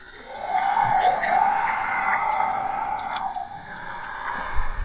Sound effects > Experimental
Creature Monster Alien Vocal FX-41
Echo, Alien, Sound, Monster, Creature, boss, Animal, Frightening, Fantasy, gutteral, Sounddesign, Vocal, fx, Snarl, devil, Ominous, Otherworldly, Monstrous, scary, gamedesign, Groan, evil, Vox, visceral, Growl, Snarling, demon, sfx, Deep, Reverberating